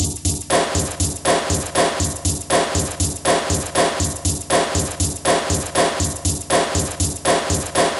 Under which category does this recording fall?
Instrument samples > Percussion